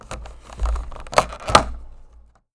Sound effects > Objects / House appliances
OBJCont-Blue Snowball Microphone, CU The Cheesecake Factory Tray, Open Nicholas Judy TDC
The Cheesecake Factory tray opening.